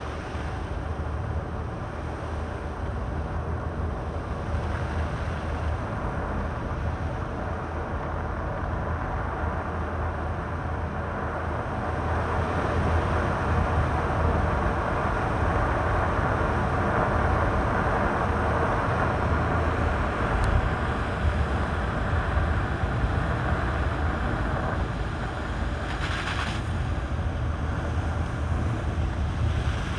Sound effects > Vehicles
recorded on zoom h1n
car, skoda, vehicle